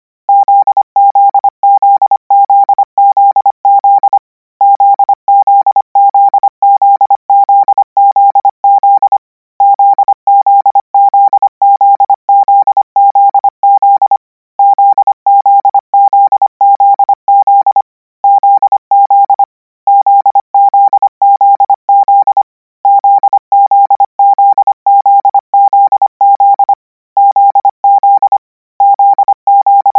Sound effects > Electronic / Design
Koch 26 Z - 200 N 25WPM 800Hz 90
Practice hear letter 'Z' use Koch method (practice each letter, symbol, letter separate than combine), 200 word random length, 25 word/minute, 800 Hz, 90% volume.
code, radio, codigo, morse, letters